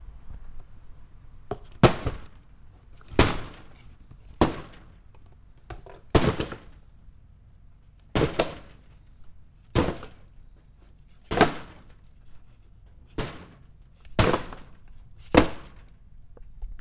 Sound effects > Objects / House appliances
Plastic blinds hit by cardboard box
I hit my plastic blinds with a small cardboard box. Used for sound design in a hurricane scene with people in a shelter listening to how objects brought by the wind are hitting the windows. Recorded stereo with Zoom H4.
blinds, flying, hitting, hurricane, plastic